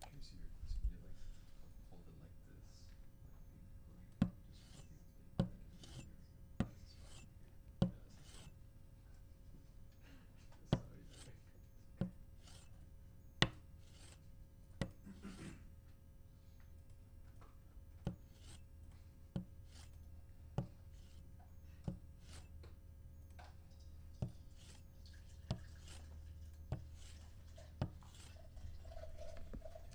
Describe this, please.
Sound effects > Objects / House appliances
Scraping on the inside of a jar